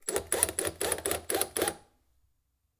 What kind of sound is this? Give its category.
Sound effects > Other mechanisms, engines, machines